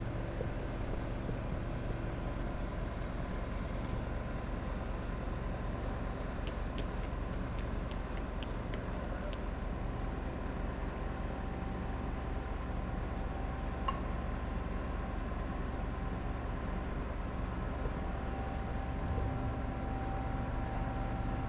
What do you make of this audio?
Soundscapes > Urban
field recording of the postboat being hoisted ashore